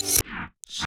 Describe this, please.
Sound effects > Experimental
Glitch Percs 17 syther fx
hiphop, sfx, snap